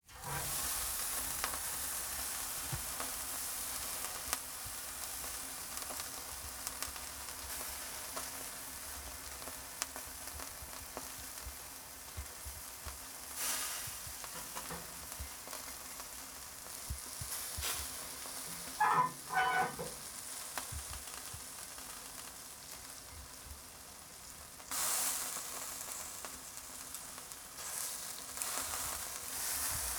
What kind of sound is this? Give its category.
Soundscapes > Indoors